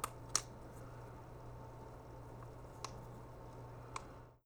Sound effects > Objects / House appliances
FOLYProp-Blue Snowball Microphone, MCU Slap Bracelet Fold, Unfold Nicholas Judy TDC
A slap bracelet folding and unfolding.
Blue-brand, Blue-Snowball, bracelet, fold, foley, slap, unfold